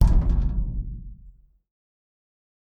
Sound effects > Other mechanisms, engines, machines
dumpster sides-1
an empty dumpster hit with fist
big, boom, drum, dumbster, hit, hollow, industrial, metal, metallic, percussion